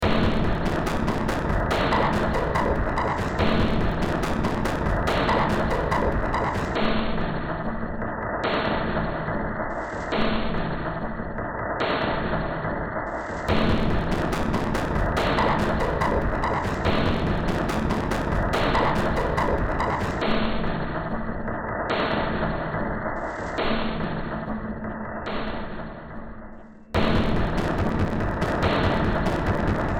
Music > Multiple instruments
Short Track #3519 (Industraumatic)
Ambient, Cyberpunk, Games, Industrial, Noise, Sci-fi, Soundtrack, Underground